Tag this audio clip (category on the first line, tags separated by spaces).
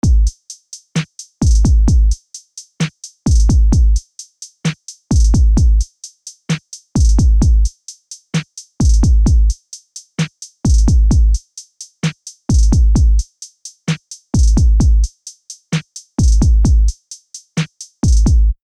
Music > Solo instrument
Bpm drums Simple trap